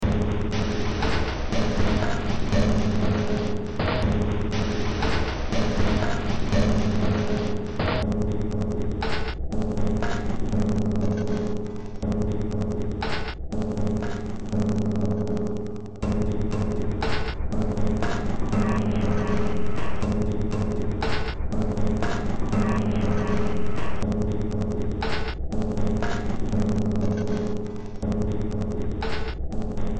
Music > Multiple instruments
Demo Track #3132 (Industraumatic)
Cyberpunk, Games, Horror, Industrial, Noise, Sci-fi, Soundtrack